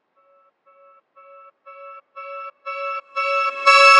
Sound effects > Electronic / Design
STABBI TWO REVERSE
A reverse synth stab. Two of two.
reverse, stab